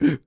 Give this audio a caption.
Speech > Solo speech
Fear - Gasp
dialogue, fear, FR-AV2, gasp, Human, inhale, Male, Man, Mid-20s, Neumann, NPC, oneshot, singletake, Single-take, talk, Tascam, U67, Video-game, Vocal, voice, Voice-acting